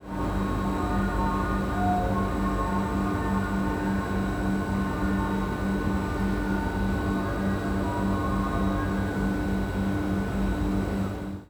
Soundscapes > Urban
Splott - Water Tower Electrical Hum Ice Cream Van - Splott Beach Costal Path
fieldrecording, splott, wales